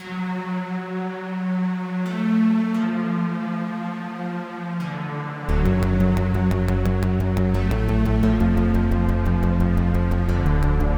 Music > Other
fragment 5 - 175 BPM
Fragment of an unfinished song with the drums removed. Use for whatever you feel like.
fragment, short, synthesizer